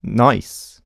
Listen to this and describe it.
Speech > Solo speech
Joyful - Hesitant - Nice
voice, oneshot, Video-game, nice, Mid-20s, Male, word, Man, Voice-acting, talk, FR-AV2, dialogue, happy, NPC, joy, U67, Neumann, singletake, Tascam, Vocal, Single-take, Human, joyful, hesitant